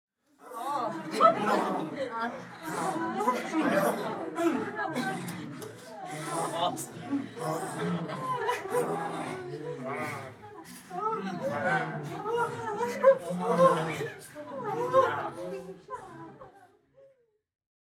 Speech > Conversation / Crowd
An Orgy
Ok, some context: this was recorded for an animated short, where a group of sectarians in a sort of trance were having a rite which eventually becomes a little bit "weird". Gear: Zoom H4n Built-In XY Mics
possessed, moan, rite, orgasm, cultist, disponible, orgy, female, cult, mixed, group, male, sect, sex